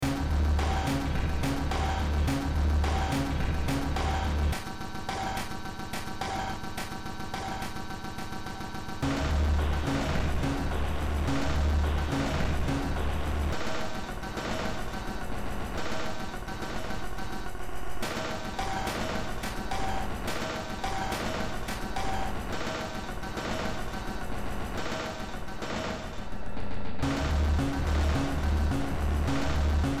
Music > Multiple instruments
Short Track #3539 (Industraumatic)
Cyberpunk, Games, Horror, Noise, Soundtrack